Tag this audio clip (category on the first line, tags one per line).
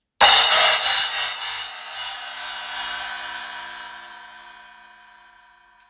Instrument samples > Percussion
solo
hihats
sticks
drums
groovy
improvised
garbage
percussion
loop
samples
snare
drum-loop
drum
hit
percussive
percussion-loop
hh
percs
acoustic